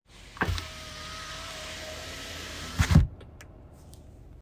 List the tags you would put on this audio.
Sound effects > Other mechanisms, engines, machines
automobile field-recording rolling-down window sound-effects rolling-up car vehicle

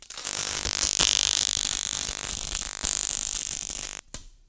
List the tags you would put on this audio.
Sound effects > Experimental
electricity zapping arc electrical spark

